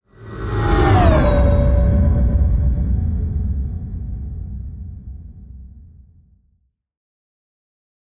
Sound effects > Other
Sound Design Elements Whoosh SFX 032
film, element, transition, production, whoosh, fx, fast, design, elements, cinematic, sound, audio, dynamic, motion, effects, ambient, effect, swoosh, movement, trailer, sweeping